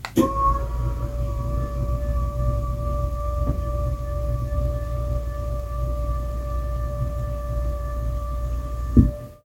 Sound effects > Objects / House appliances
MOTRSrvo-Samsung Galaxy Smartphone, CU Servo Motor, Bed, Lower Nicholas Judy TDC
A bed servo motor lowering.
Phone-recording, lower